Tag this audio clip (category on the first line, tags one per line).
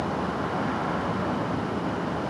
Soundscapes > Urban
Effect
LVI
Air
Short
Game
HVAC
Sound
Conditioner